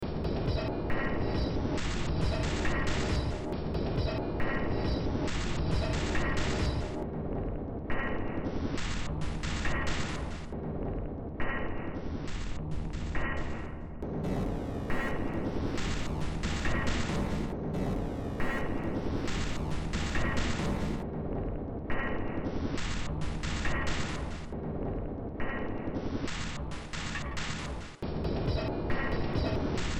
Music > Multiple instruments
Short Track #3184 (Industraumatic)
Ambient, Cyberpunk, Games, Horror, Industrial, Noise, Sci-fi, Soundtrack, Underground